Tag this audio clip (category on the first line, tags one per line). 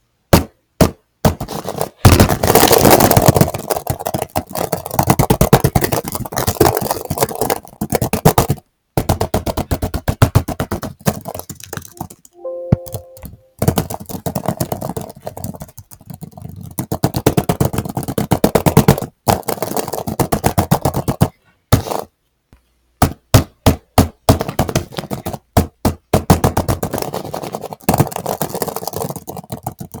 Sound effects > Objects / House appliances
keyboard
keyboard-smash
keyboardsmash
smash